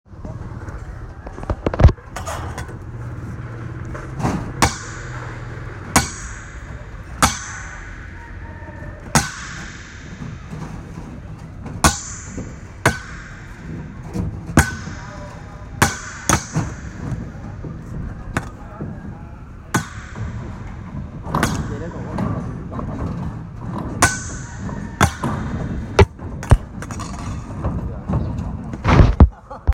Sound effects > Objects / House appliances
Dây Chì Gõ Ống Thép Hình Chữ Nhật Dài 1 - Wire Hit Steel Pipe
Wire hit long metal pipe. Use iPhone 7 Plus smart phone 2025.12.30 16:59